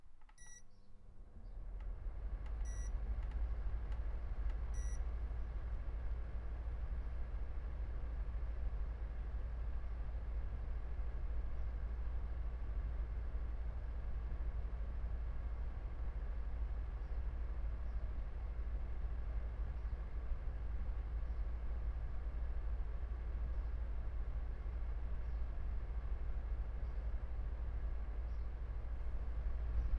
Sound effects > Objects / House appliances
We have an air purifier in our bedroom. This is a recording of turning it on, setting some settings and letting it run for a while. God knows if it actually makes a difference....